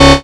Instrument samples > Synths / Electronic
DRILLBASS 4 Db
additive-synthesis, bass, fm-synthesis